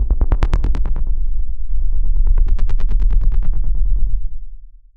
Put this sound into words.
Instrument samples > Synths / Electronic
CVLT BASS 104
synthbass, wobble, bassdrop